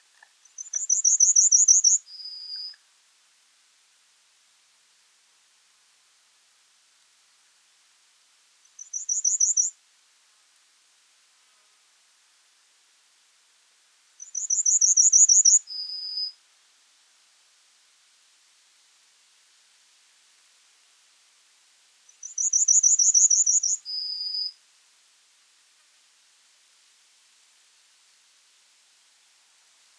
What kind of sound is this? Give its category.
Soundscapes > Nature